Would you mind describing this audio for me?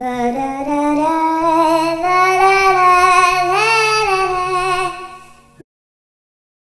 Music > Solo instrument
Soulful Pitch Shift Female Vocals
A processed snippet of singing by the great Kait in Arcata, CA at Studio CVLT. Some light reverb and mod effects from the TC Helicon VoiceLive 2 and further processed in Reaper. Enjoy~
speech, isolated, fx, vibe, gospel, vox, woman, vocals, singing, studio, melodic, processed, melody, south, track, chant, enigmatic, voice, sing, female, beautiful, vocal, reverb, soul, pretty, chill, soulful, eastern